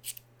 Sound effects > Other
LIGHTER FLICK 17
flick, lighter, zippo